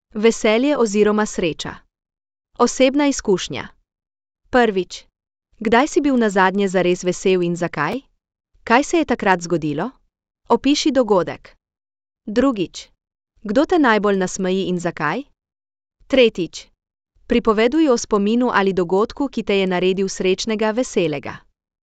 Speech > Solo speech
1. VESELJE - Osebna izkušnja
women, speech, questions, emotions, cards, happiness. The sounds were created using the WooTechy VoxDo app, where we converted the text with questions into an audio recording.